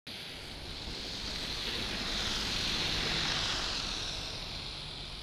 Sound effects > Vehicles
tampere bus14
transportation, bus, vehicle